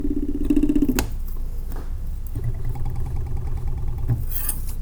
Sound effects > Objects / House appliances
knife and metal beam vibrations clicks dings and sfx-101
Beam, Clang, ding, Foley, FX, Klang, Metal, metallic, Perc, SFX, ting, Trippy, Vibrate, Vibration, Wobble